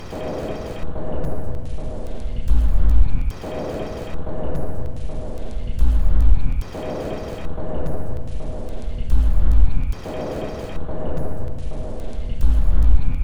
Soundscapes > Synthetic / Artificial
This 145bpm Ambient Loop is good for composing Industrial/Electronic/Ambient songs or using as soundtrack to a sci-fi/suspense/horror indie game or short film.
Industrial, Samples, Soundtrack, Loop, Dark, Ambient